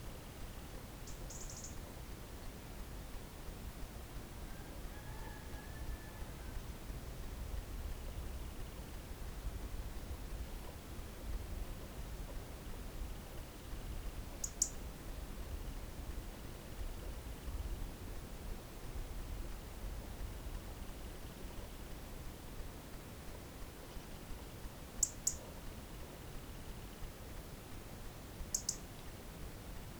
Soundscapes > Nature
20250907 06h33m30 Gergueil Bird
Subject : Ambience recording of Gergueil, at the start of "Brame" season (Stags shouting). My uncle saw some that boars digged a fair bit around fields and so I was trying to record that. Date YMD : 2025 September 07 19h53 Location : Gergueil 21410 Bourgogne-Franche-Comté Côte-d'Or France. GPS = 47.23784608300959, 4.822730587340072 Facing NW. Hardware : Zoom H2n with a sock as a windcover. Held up in a tree using a Smallrig magic arm. Weather : Processing : Removing 1 gain on side channels. Trimmed and normalised in Audacity. Notes : That night, I recorded with 4 microphones around the village.
MS-RAW, H2n, Cote-dOr, France, MS, Bourgogne, night, Zoom, rural, country-side, 21410, countryside, Bourgogne-Franche-Comte, Gergueil